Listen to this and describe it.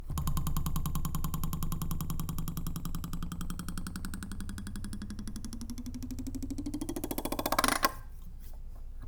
Sound effects > Objects / House appliances
knife and metal beam vibrations clicks dings and sfx-102
Trippy, Wobble, Perc